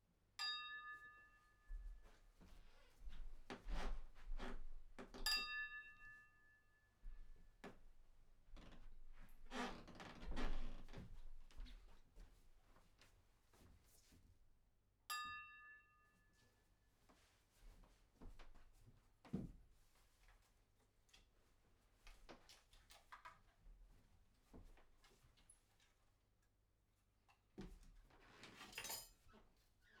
Sound effects > Objects / House appliances
People interaction noise - 7
A series of me recording multiple takes in a medium sized bedroom to fake a crowd. Clapping/talking and more atypical applause types and noises, at different positions in the room. Here interacting with different objects and stuff. Recorded with a Rode NT5 XY pair (next to the wall) and a Tascam FR-AV2. Kind of cringe by itself and unprocessed. But with multiple takes mixed it can fake a crowd. You will find most of the takes in the pack.
FR-AV2, indoor, Interaction-noise, noise, noises, NT5, objects, person, presence, Rode, room, solo-crowd, stuff, Tascam, XY